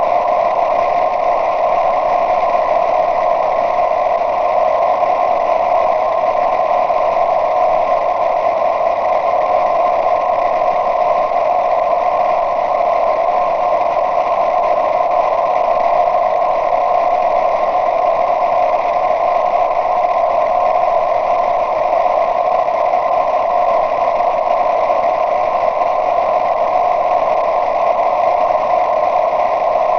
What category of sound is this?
Sound effects > Experimental